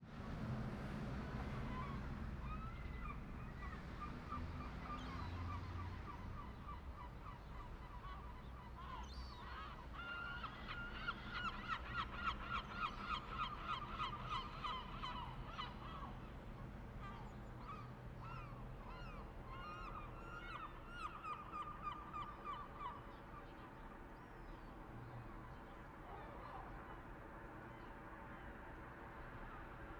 Soundscapes > Nature
Street. Morning. Birds. Cars. Ambient
Morning, Birds, Street, Cars, Ambient